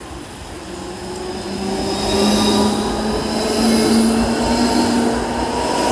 Sound effects > Vehicles
tram rain 08
tram, motor, rain